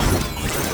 Sound effects > Electronic / Design
A glitch one-shot SX designed in Reaper with Phaseplant and various plugins.
hard, stutter, pitched, one-shot, mechanical, glitch, digital